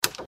Sound effects > Other mechanisms, engines, machines
Typewriter Key Press 01
Macro & Meso: This is a single, distinct key press from a vintage typewriter. The sound is a sharp, dry "tick" with no discernible background noise. Micro: The audio captures the raw, pure acoustic signature of the typewriter's key action. The sound is unadorned by echo or subtle ambient tones, focusing entirely on the percussive, metallic sound of the key striking the paper platen. Technical & Method: This sound was recorded approximately 2 years ago using an iPhone 14 smartphone in a quiet office room. The audio was processed using Audacity to remove any ambient noise, ensuring a clean and isolated sound. Source & Purpose: The typewriter is a real, classic Brazilian model, similar to a well-known brand such as the Olivetti Lettera 22. The purpose of this recording was to capture and preserve the unique, distinct sound of an iconic mechanical device for use in sound design, Foley, and other creative projects.